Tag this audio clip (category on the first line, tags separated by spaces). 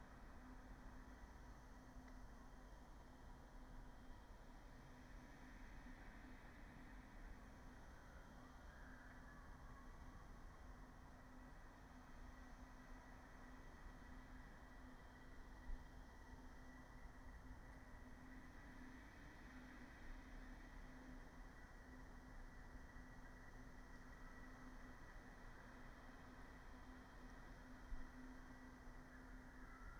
Soundscapes > Nature
alice-holt-forest data-to-sound field-recording modified-soundscape natural-soundscape nature phenological-recording raspberry-pi sound-installation soundscape weather-data